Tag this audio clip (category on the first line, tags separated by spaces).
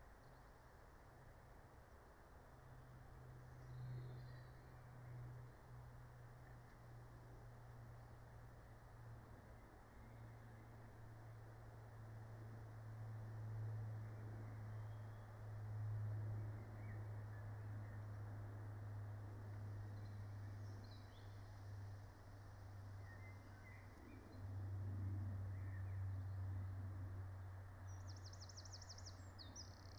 Soundscapes > Nature
meadow natural-soundscape raspberry-pi field-recording alice-holt-forest soundscape phenological-recording nature